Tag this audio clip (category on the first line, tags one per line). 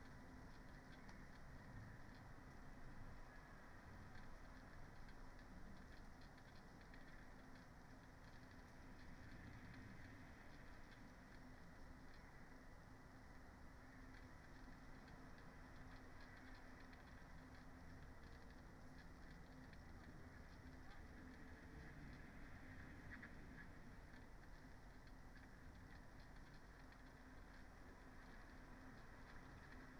Soundscapes > Nature
weather-data
artistic-intervention
raspberry-pi
field-recording
sound-installation
soundscape
Dendrophone
alice-holt-forest
phenological-recording
nature
natural-soundscape
modified-soundscape
data-to-sound